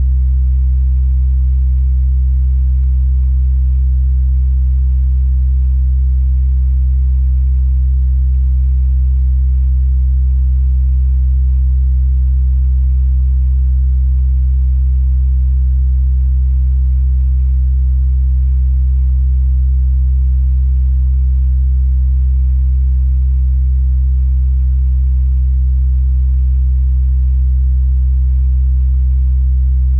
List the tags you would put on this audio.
Sound effects > Other mechanisms, engines, machines
hum MKE600 Hypercardioid MKE-600 very-close-up very-close Shotgun-mic FR-AV2 side buzz Shotgun-microphone air Fan Sennheiser noise Tascam side-mic 3-blade Single-mic-mono humm 64hz

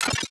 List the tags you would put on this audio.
Instrument samples > Percussion
Glitch FX Digital Cymbal Effect

